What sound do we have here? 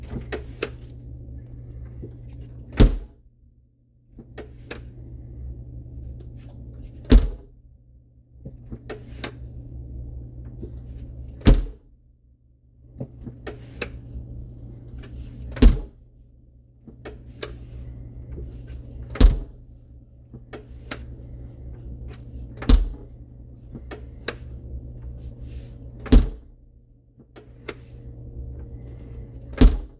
Sound effects > Objects / House appliances
DOORAppl-Samsung Galaxy Smartphone, CU Freezer, Large, Open, Close Nicholas Judy TDC
A large indoor freezer door opening and closing.
close, large, freezer, foley, indoor, open